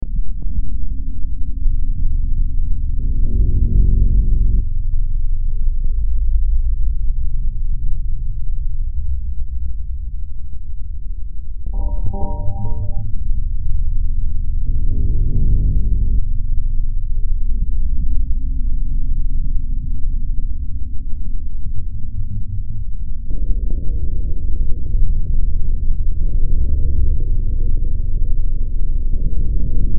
Soundscapes > Synthetic / Artificial
Looppelganger #172 | Dark Ambient Sound
Use this as background to some creepy or horror content.
Drone, Hill, Weird, Survival, Darkness, Sci-fi, Silent, Ambient, Underground, Horror, Soundtrack, Gothic, Games, Ambience, Noise